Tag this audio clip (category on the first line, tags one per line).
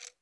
Sound effects > Other mechanisms, engines, machines
clacking
clock
minute
second
seconds
ticking
ticks
tick-tock